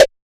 Instrument samples > Synths / Electronic
A wood-like percussion made in Surge XT, using FM synthesis.
synthetic, fm, electronic, surge